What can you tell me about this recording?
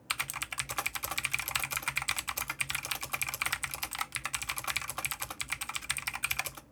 Sound effects > Objects / House appliances
Fast Mechanical Keyboard Typing 01
Fast typing on a mechanical keyboard. Take 1 Keyboard: havit HV-KB389L - Blue Switches